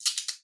Instrument samples > Percussion
Cellotape Percussion One Shot17
one, shots, adhesive, sample, creative, sounds, electronic, found, sound, experimental, organic, percussion, ambient, samples, IDM, foley, tape, glitch, drum, design, pack, lo-fi, shot, cinematic, unique, texture, cellotape, DIY, layering